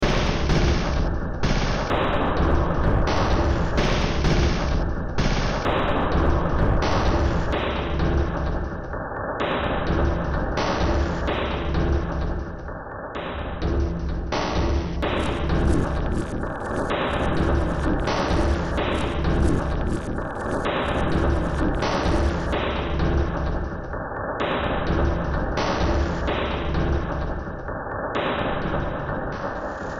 Music > Multiple instruments
Demo Track #3124 (Industraumatic)
Horror, Industrial, Cyberpunk, Soundtrack, Ambient, Games, Underground, Sci-fi